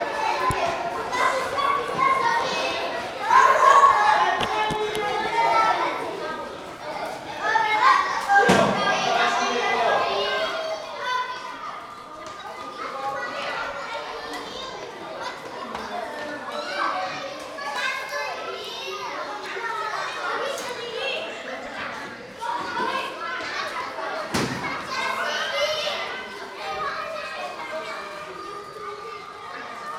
Soundscapes > Indoors
5- Vozerio crianças brincando na escola - Kids playing on school (brazilian portuguese)
Áudio gravado no Colégio Objetivo de Botafogo, na cidade do Rio de Janeiro (Rua Álvaro Ramos, n° 441) no dia 6 de outubro de 2022. Gravação originalmente feita para o documentário "Amaro: O Colégio da Memória", sobre o vizinho Colégio Santo Amaro, que fechou durante a pandemia. Crianças brincando e conversando, relativamente perto do microfone. Vozes de adultos (inspetores) aparecem ocasionalmente e há uma faxineira varrendo o chão. No final, falo que foi gravado no lugar onde as crianças estavam lanchando. Foi utilizado o gravador Zoom H1N. // Audio recorded at the Objetivo School in the Botafogo neighborhood, in Rio de Janeiro, on the october 6th, 2022. Recording originally made for the brazilian documentary feature film "Amaro: The School in Our Memory", which tells the story of the Santo Amaro School, also located in Botafogo, but closed during the pandemic. Children playing and talking, relatively close to the microphone.